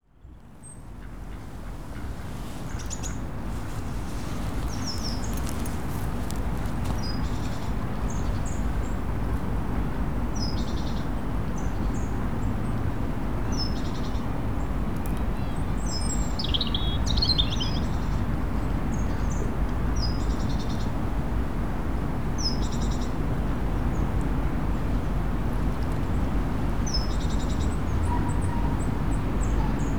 Soundscapes > Nature
A recording at Sanders park, Bromsgrove. Morning time.